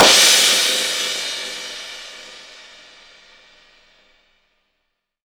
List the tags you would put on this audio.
Instrument samples > Percussion
Sabian
Zildjian
sinocrash
multi-China
spock
metal
crunch
low-pitched
Paiste
bang
crash
China
multicrash
Zultan
clang
smash
polycrash
cymbal
Istanbul
metallic
Soultone
Meinl
Avedis
Stagg
shimmer
crack
clash
sinocymbal

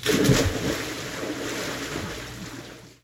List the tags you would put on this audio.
Sound effects > Natural elements and explosions
water
big
splash
person
Phone-recording
animal
dive

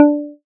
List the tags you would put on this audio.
Instrument samples > Synths / Electronic
fm-synthesis
pluck
additive-synthesis

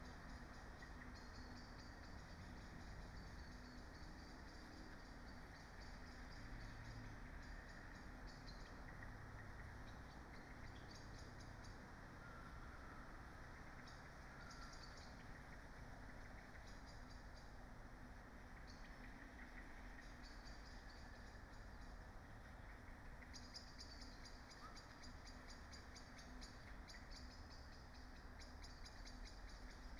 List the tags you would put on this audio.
Soundscapes > Nature
field-recording
modified-soundscape
data-to-sound
phenological-recording
alice-holt-forest
natural-soundscape
artistic-intervention
raspberry-pi
Dendrophone
soundscape
nature
sound-installation
weather-data

